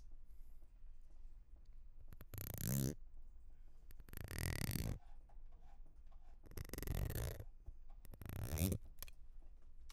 Soundscapes > Other
I tried to create a zipper sound by using two plastic knives that were recorded using a condenser mic